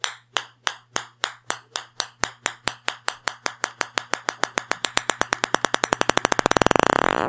Soundscapes > Indoors
A general/plastic ping pong ball bounces on a concrete floor. Microphone held in hand chases the bouncing ball. Sound edited to remove the noise, meta tags etc. On each bounce, the repetition time reduces, i.e. ball gradually hits the floor faster and faster, and it ultimately comes into silence.